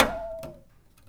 Sound effects > Other mechanisms, engines, machines
Handsaw Pitched Tone Twang Metal Foley 24
metal, fx, twang, foley